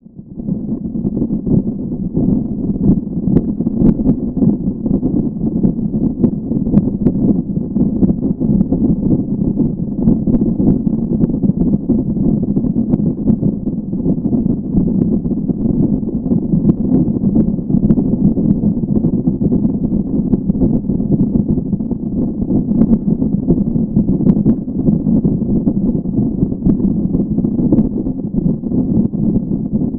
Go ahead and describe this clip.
Sound effects > Electronic / Design
Intergalactic thunderstorm sound created with a synth sound effect pad in Cakewalk.